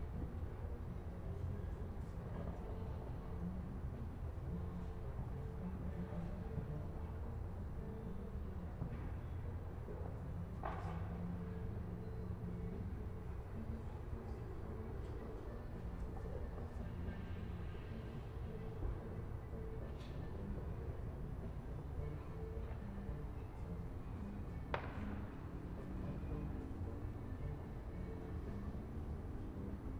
Soundscapes > Urban
AMBUrbn Rooftop City Christmas Night with Distant Music and Near and Far Fireworks HushAndHarmony
This recording was taken at a rooftop in Guadalajara, MX in the hours leading up and through Christmas day. You can hear plenty of fireworks, music in the distance, distant party chatter, traffic, sirens and more urban sounds. #34:58 - Car Alarm #41:23 - Interesting Crackles #60:56 - Clearer, Louder Band Music #64:48 - Car Engine Starts #86:10 - Very Clear Fireworks Whistle #103:05 - Keys Jingling #105:51 - Metal Clang #120:59 - Loud Close Firework Pops
ambiance, field-recording, city